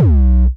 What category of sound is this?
Instrument samples > Synths / Electronic